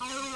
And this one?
Sound effects > Other
TOONSqk-Blue Snowball Microphone, MCU Groan Tube, Low Tone Nicholas Judy TDC
A low toned groan tube.